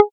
Instrument samples > Synths / Electronic
APLUCK 8 Ab
pluck, additive-synthesis, fm-synthesis